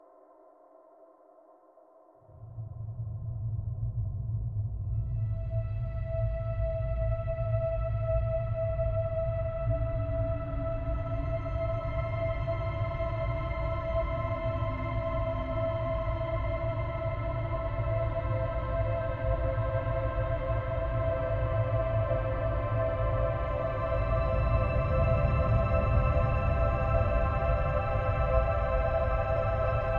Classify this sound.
Music > Multiple instruments